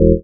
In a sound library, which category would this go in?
Instrument samples > Synths / Electronic